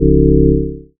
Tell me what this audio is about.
Instrument samples > Synths / Electronic
WHYBASS 1 Db
additive-synthesis, bass, fm-synthesis